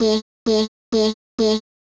Speech > Solo speech
BrazilFunk Vocal Chop One-shot 6 130bpm
BrazilFunk, One-shot, Vocal